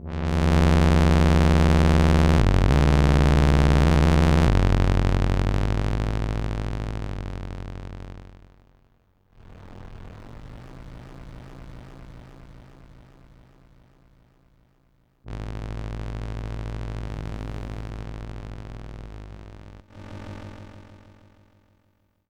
Sound effects > Experimental
Analog Bass, Sweeps, and FX-034
complex, basses, snythesizer, analogue, machine, pad, analog, dark, korg